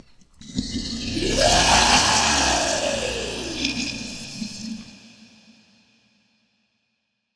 Sound effects > Experimental
Creature Monster Alien Vocal FX (part 2)-024
A collection of alien creature monster sounds made from my voice and some effects processing